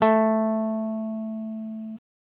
Instrument samples > String
Random guitar notes 001 A3 03
electric, electricguitar, guitar, stratocaster